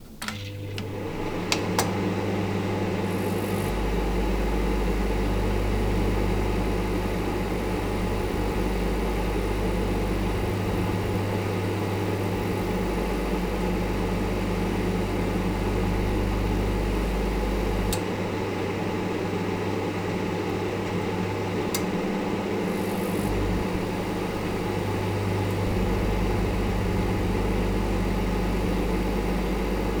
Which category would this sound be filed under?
Sound effects > Objects / House appliances